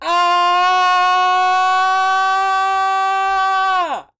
Speech > Solo speech
VOXScrm-Blue Snowball Microphone, CU Scream, Long Nicholas Judy TDC
A long scream.
Blue-brand, Blue-Snowball, long, male, scream